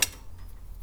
Sound effects > Other mechanisms, engines, machines
metal shop foley -171
bam, bang, boom, bop, crackle, foley, fx, knock, little, metal, oneshot, perc, percussion, pop, rustle, sfx, shop, sound, strike, thud, tink, tools, wood